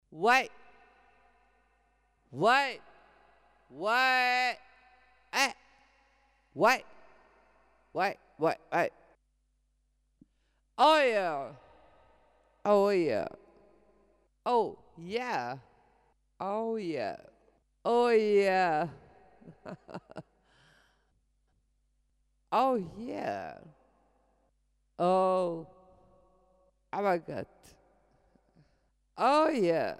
Sound effects > Experimental
Oh yeah
background, crasy, female, funy, fx, genre, girl, vocal, voice